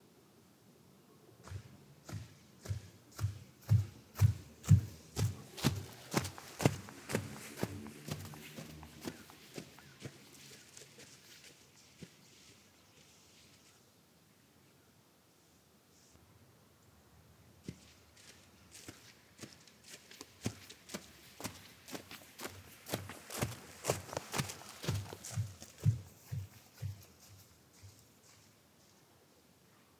Sound effects > Human sounds and actions
walking angry
Angry walking in the forest
walking, forest, walk